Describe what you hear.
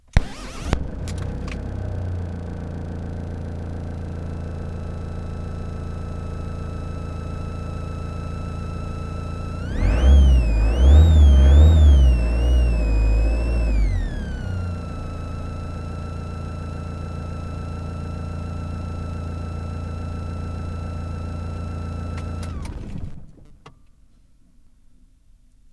Sound effects > Vehicles
Ford 115 T350 - Engine from interior passanger leg area (EMF interference)
Tascam, 2003-model, 2025, SM57, T350, Single-mic-mono, France, Old, August, Ford-Transit, 2003, Van, A2WS, Vehicle, FR-AV2, Mono, 115, Ford